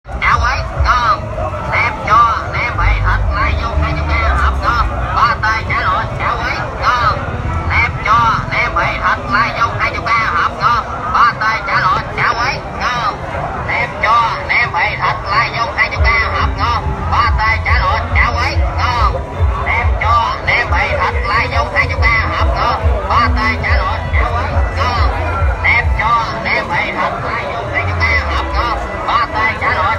Speech > Solo speech
Nam sell food say 'Nem tro, nem huê thạch, lai vung 20 ngàn hộp, Ngon! Pa tê, chả lụa, chả huế, ngôn!'. Record use iPhone 7 Plus smart phone 2025.05.12 20:32
sell, nem, food, business